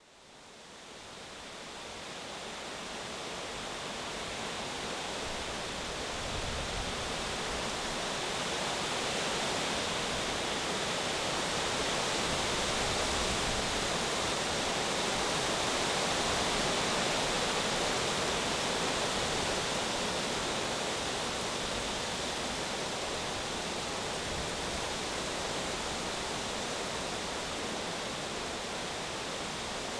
Soundscapes > Nature
A recording of wind passing through some trees at Macclesfield Forest.
forest, field-recording, nature, wind, trees